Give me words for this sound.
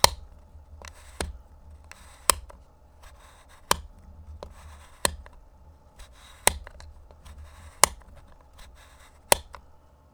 Sound effects > Objects / House appliances
MECHMisc-Blue Snowball Microphone, CU Pencil Sharpener, Mechanical, Switch Pencil Holes Nicholas Judy TDC
A mechanical pencil sharpener switching pencil holes.